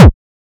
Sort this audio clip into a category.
Instrument samples > Percussion